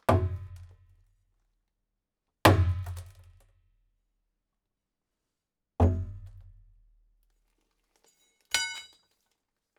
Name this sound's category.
Sound effects > Objects / House appliances